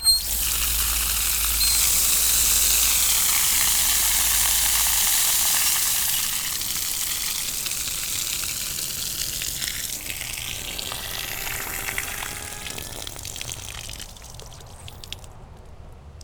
Sound effects > Objects / House appliances
Outdoor hose on high pressure then slowly turn off.
hose, pressue, off